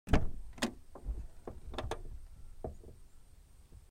Sound effects > Vehicles
Car door opening (external)